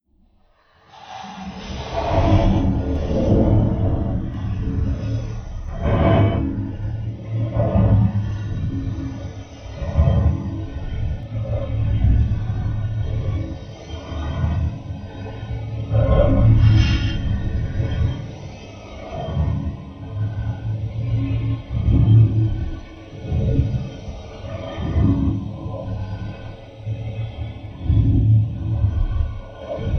Sound effects > Experimental
Magic - Magnetic Force Field
Experimental force field, sound design for rendering a magic shield, flying orb or mystic sphere. I significantly slowed down the sound of a rotating planisphere, stretched it, and processed it to achieve a more dramatic sound. Taken separately, the small spinning sounds can be useful for imitating the disappearance of an object: as at the end of the track before and after the rusty whistle * Stretched sound. * Experimental sound design.
fantasy, witch, magic, adventure, spin, protection, teleport, game, magical, mouvement, effect, wizard, circle, sphere, game-sound, disappearance, force, shield, priest, disappear, spinning, magician, forcefield, rpg, orb, teleportation, magnetic, field, spell